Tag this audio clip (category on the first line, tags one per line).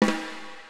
Music > Solo percussion
rim
kit
processed
beat
oneshot
snare
acoustic
realdrums
realdrum
hits